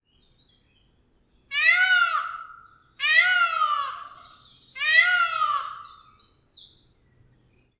Sound effects > Animals
Peacock call
call, spooky